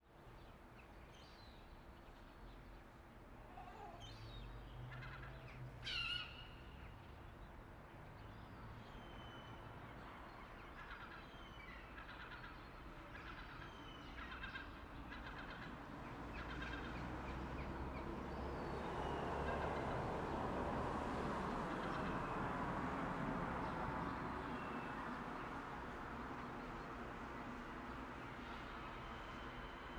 Soundscapes > Nature
Street. Morning. Birds. Cars. Ambient
Ambient, Birds, Cars, Morning, Street